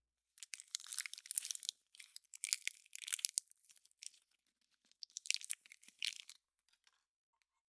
Sound effects > Objects / House appliances
up close personal foil wrapper-003
foley perc sfx fx percussion sample field recording
perc, percussion, recording, sample, sfx